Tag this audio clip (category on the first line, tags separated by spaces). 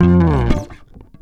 Instrument samples > String
mellow funk rock oneshots pluck plucked blues loops fx electric charvel riffs slide bass loop